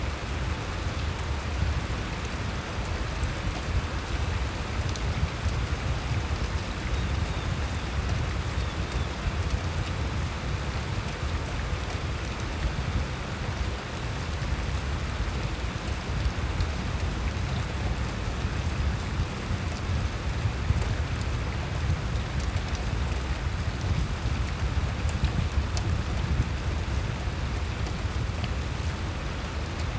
Sound effects > Natural elements and explosions
Rain Falling Hard Outside
Rain falling hard on building outside Sound is my own, recorded on an iPhone 12